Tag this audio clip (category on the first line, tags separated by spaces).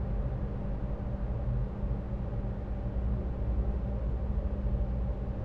Soundscapes > Other
atmospheric ambient noise tube